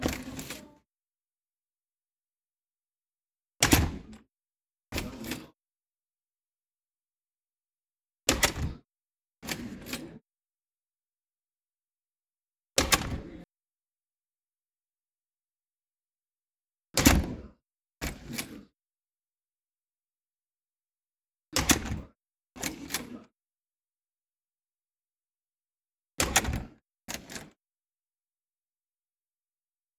Sound effects > Objects / House appliances
DOORWood-Samsung Galaxy Smartphone, CU 8 Lite VistaGrande SDL, Open, Close Nicholas Judy TDC
An 8 Lite VistaGrande SDL door opening and closing. Recorded at The Home Depot.
close, door, foley, open, Phone-recording